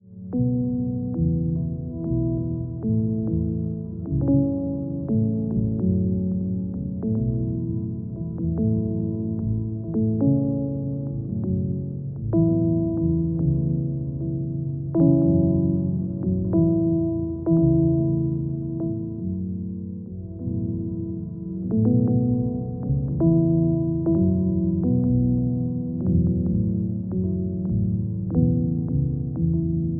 Soundscapes > Synthetic / Artificial
Botanica-Granular Ambient 13
Beautiful, Ambient, Atomosphere